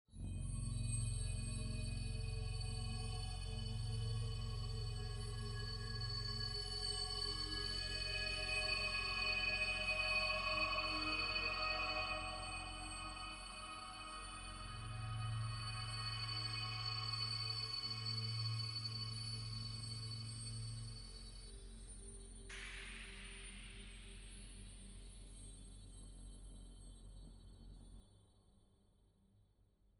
Sound effects > Electronic / Design
Magic Riser
A Riser Magic Sound created with different synths, tried to create a bright sound that indicates pureness and goodness, designed with Pigments via studio One
bell, chimes, fairy, magic, Riser, sparkle, spell